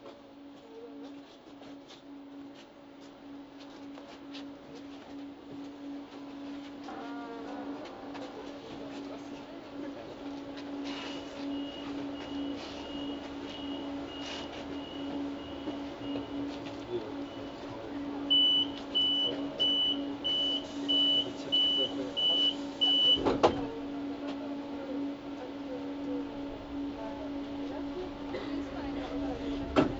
Soundscapes > Urban
Old recording, made probably with phone, during my 2015 January work commute. Part 7: Tricity Train Ride This is the whole ride recording. You can hear the train, commuters chatter and other noises.